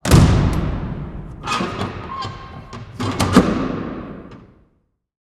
Sound effects > Other
Closing large metal door-001
bunker, clang, closing, door, echoing, fortress, gate, heavy, industrial, large, metal, resonant, scraping, sealing, secure, slam, thud, vault